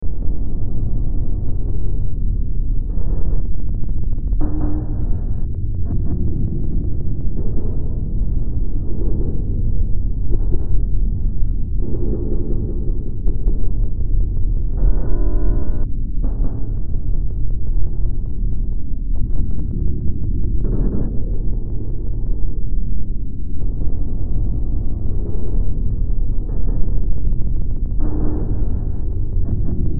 Soundscapes > Synthetic / Artificial
Use this as background to some creepy or horror content.